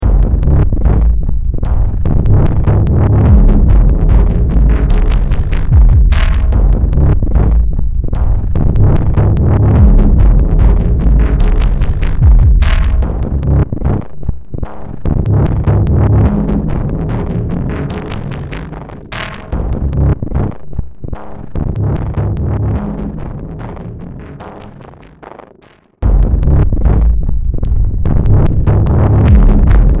Music > Multiple instruments
Demo Track #3165 (Industraumatic)
Games, Horror, Ambient, Underground, Cyberpunk, Sci-fi, Noise, Industrial, Soundtrack